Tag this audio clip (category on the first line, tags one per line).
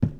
Sound effects > Objects / House appliances
bucket
carry
clang
debris
drop
foley
plastic
pour
scoop
slam
tip